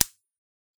Sound effects > Other mechanisms, engines, machines
Upside-down circuit breaker switch-001
When it's upside-down the switch reproduce a slightly different sound, a bit dryer and with a shorter release time. There are also samples in the pack that attend the antithesis. Please follow my socials, don't be rude..
sampling, foley